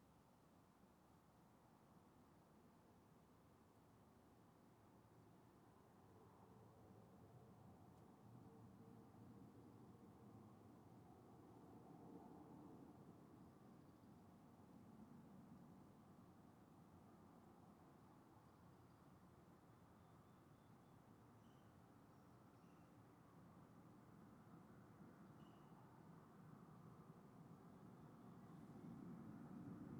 Soundscapes > Urban
Air North 737 take-off
Air North flight 702 to Edmonton and Calgary takes off from the north end of the runway at Erik Nielsen International Airport in Whitehorse, Yukon, around 7:00 a.m. on Sunday, August 17, 2025. The sound of commercial jets taking off from the airport is a very common sound in the Whitehorse valley; it can vary considerably depending on the weather and time of year. Gratuitous squirrel at the end. Recorded on a ZoomH2n in 150-degree stereo mode, at a Riverdale location approximately 1.5 kilometers east of the runway’s south end.